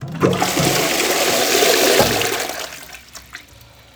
Sound effects > Objects / House appliances
Flushing the toilet water Recorded at my home with Tascam Portacapture X6 and Leaf Audio Contact Microphone positioned on the toilet.